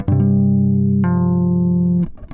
Music > Solo instrument
rich chord warm 1
notes, chords, slides, fuzz, lowend, pluck, funk, riffs, harmonic, note, harmonics, pick, chuny, bassline, bass, rock, basslines, slap, blues, riff, slide, electric, low, electricbass